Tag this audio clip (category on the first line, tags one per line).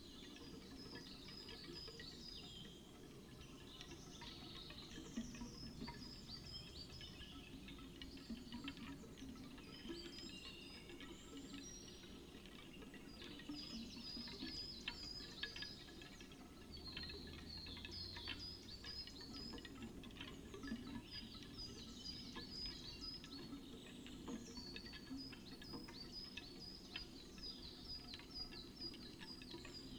Soundscapes > Nature
artistic-intervention
data-to-sound
modified-soundscape
soundscape
alice-holt-forest
phenological-recording
nature